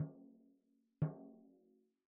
Music > Solo percussion
Med-low Tom - Oneshot 49 12 inch Sonor Force 3007 Maple Rack
acoustic, beat, drum, drumkit, drums, flam, kit, loop, maple, Medium-Tom, med-tom, oneshot, perc, percussion, quality, real, realdrum, recording, roll, Tom, tomdrum, toms, wood